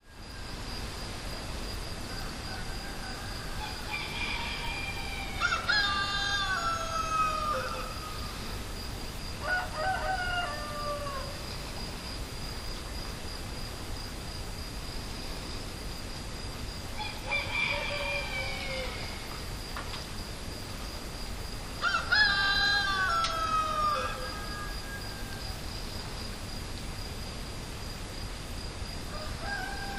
Soundscapes > Nature
6AM country morning atmos with roosters and cicadas
Recorded at 6 AM on an August morning in the Cycladic island of Tinos in Greece. A variety of roosters and cicadas can be heard as the Panormos Bay wakes up. Field-recording using an Olympus LS-11 linear PCM recorder. 16-bit, stereo.
6AM, ambiance, roosters, atmos, ambience, island, country, Greece